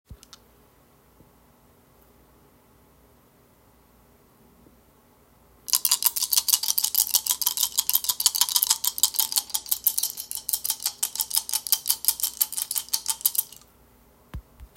Sound effects > Objects / House appliances
Closed hands rolling 4 dice
Shaking four dice in a closed fist.
Dice, Boardgame